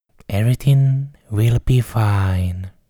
Speech > Solo speech
everything will be fine
voice, calm, human, man, male